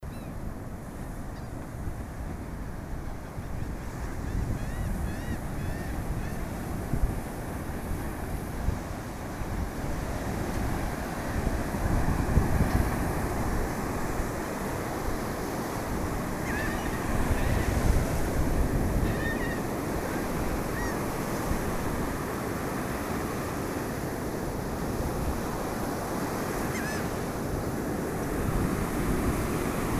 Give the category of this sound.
Soundscapes > Nature